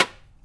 Sound effects > Other mechanisms, engines, machines
Woodshop Foley-014
oneshot, percussion, wood, bop, pop, perc, knock, tink, foley, crackle, shop, thud, bang, rustle, boom, bam, metal, fx, little, strike, tools, sound, sfx